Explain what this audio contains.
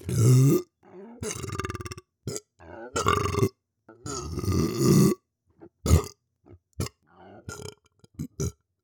Speech > Other
Male Belches
Several of my own belches that I recorded. Slightly post-processed.